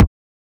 Instrument samples > Percussion
Synthed with 3xOsc only. Plugin used: Khs Distortion, Waveshaper, ZL EQ.